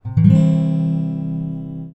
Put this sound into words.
Music > Solo instrument
c chord on guitar. Recorded by myself on a Zoom Audio Recorder.
music, guitar, strum